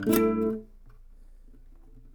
Music > Solo instrument
acoustic guitar pretty chord 2
pretty, slap, string, strings